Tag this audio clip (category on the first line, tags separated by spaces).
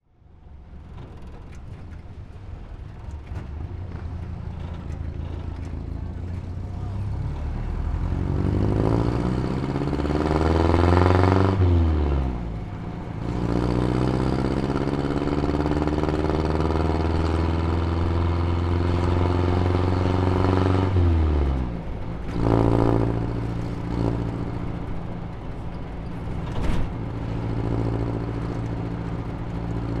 Sound effects > Vehicles
ambience atmosphere Batangas bump cars engine exhaust-pipe exhaust-system honking horn jeepney jolt lurch motorcycles noise Philippines travel trip trucks vehicle